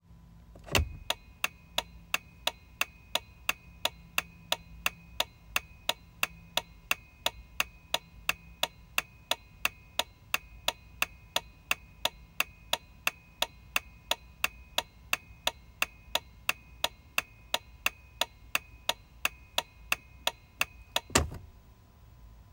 Sound effects > Vehicles
Jeep Wrangler Turn Signal
Turn signal/blinker on a Jeep Wrangler Sahara.
auto, turn-signal, Jeep, car, blinker, automobile